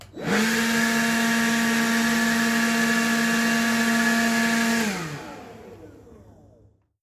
Sound effects > Other mechanisms, engines, machines
TOOLPowr-Samsung Galaxy Smartphone, CU Bauer 20V Leaf Blower, Turn On, Run, Off, Low Speed Nicholas Judy TDC
A Bauer 20V leaf blower turning on, running at low speed and turning off.
Phone-recording, low-speed